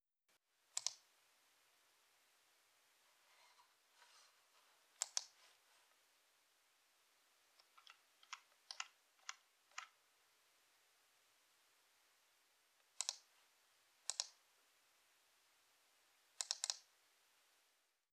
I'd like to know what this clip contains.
Sound effects > Objects / House appliances
Mouse click
Clicking and scrolling with mice
computer, mouse